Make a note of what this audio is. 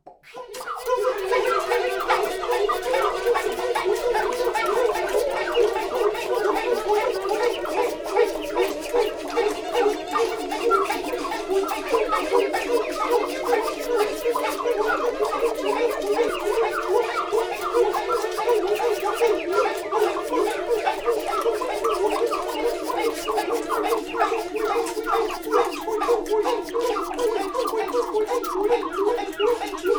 Sound effects > Human sounds and actions
Alien applause Bus
freesound20, cheering, mixed, processed, cheer, FR-AV2, Tascam, XY, experimental, indoor, applaud, Rode, applause, NT5, alien, crowd, solo-crowd